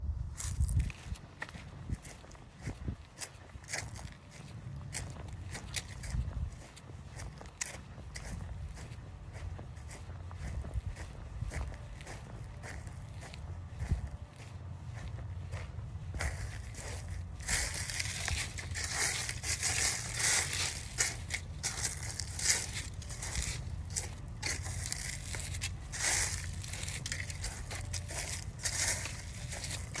Soundscapes > Nature
Slow walk in leaves with wind
I recorded this on my iPhone 17 Pro Max or me walking slowly through the leaves in my yard.
crunch, footsteps, leaves, wind